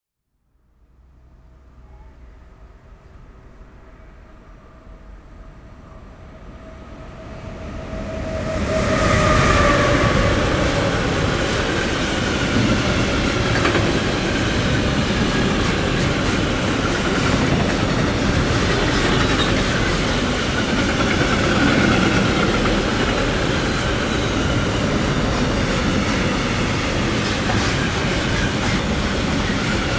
Soundscapes > Urban
Cargo Train 2
Cargo train loaded with brand new vehicles passing by and recorded at close range. Recorded on Zoom H4n Pro (stock mics)
ambient, cargo, freight, industrial, locomotive, metal, rail, railway, rumble, train, transportation